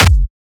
Instrument samples > Percussion
BrazilianFunk Kick-Determined Kick-Max Loudness
Powerkick, punchy, brazilianfunk, smashy, Powerful